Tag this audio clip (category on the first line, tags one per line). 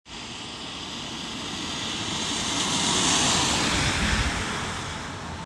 Sound effects > Vehicles
car
tampere